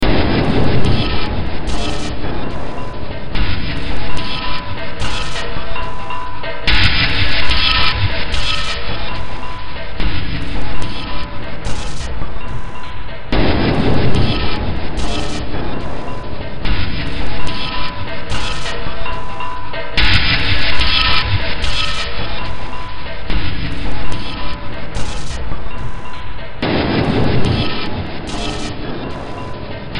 Music > Multiple instruments
Demo Track #2949 (Industraumatic)
Noise, Horror, Industrial, Underground, Cyberpunk, Games, Soundtrack, Sci-fi, Ambient